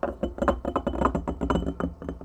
Sound effects > Objects / House appliances
A glass bottle rolling.